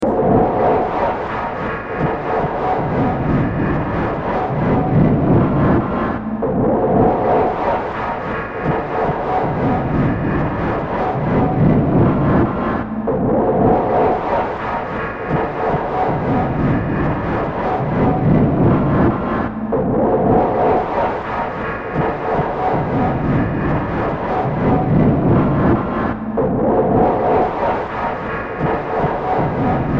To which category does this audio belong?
Soundscapes > Synthetic / Artificial